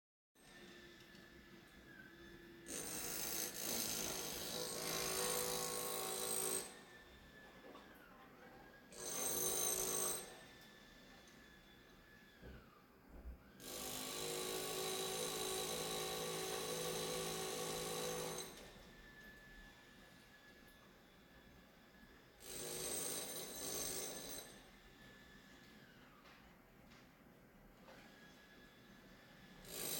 Soundscapes > Urban
Street ambience with a jackhammer from a construction site across the street. Urban outdoor work noise, steady and loud. Recorded from inside an apartment with an open window, facing a construction site across the street. No traffic or voices audible. Recorded from my place with a smartphone microphone (Samsung Galaxy S22).